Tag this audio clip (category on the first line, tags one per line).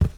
Sound effects > Objects / House appliances
tip
kitchen
tool
handle
hollow
garden
pail
debris
bucket
cleaning
drop
fill
scoop
slam
lid
plastic
foley
carry
metal
shake
liquid
household
container
clang
clatter